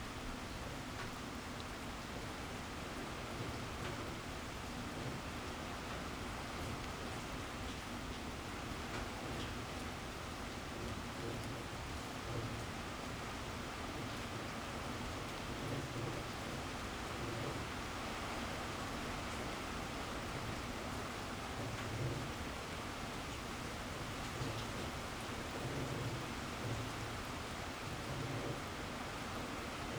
Soundscapes > Nature
Heavy Rain Downpour
Recorded during the rain of Cyclone Alfred, March 2025, Brisbane, Australia. Heavy rain with dripping onto outdoor brick surface and roof.